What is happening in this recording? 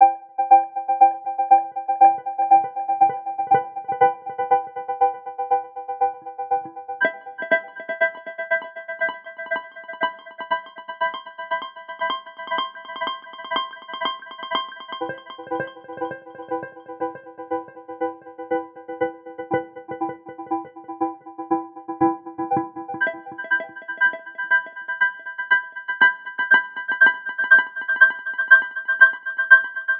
Music > Solo instrument
Jazz Guitar Granular Brilliant Texture Loop at 120bpm
I recorded C major scale notes with my guitar and then I used digitakt 2 as granulary synthetizer
120-bpm, acousting, brilliant